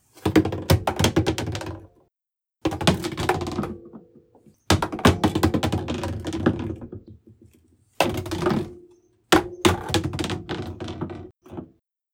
Sound effects > Objects / House appliances
Plastic bottle - Fall
A hard plastic bottle bounces on the ground. This sound can be used to toss a bottle into a recycling bin, and it works quite well. * No background noise. * No reverb nor echo. * Clean sound, close range. Recorded with Iphone or Thomann micro t.bone SC 420.